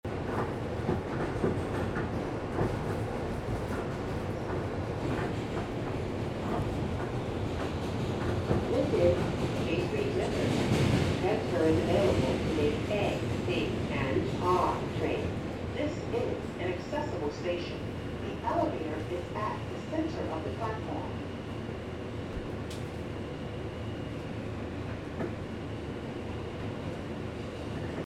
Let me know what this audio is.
Soundscapes > Urban
on the train to school
announcement, station, train